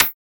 Instrument samples > Synths / Electronic
A snare rim one-shot made in Surge XT, using FM synthesis.